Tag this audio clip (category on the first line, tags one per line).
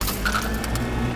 Sound effects > Electronic / Design
digital
electronic
glitch
mechanical
one-shot
stutter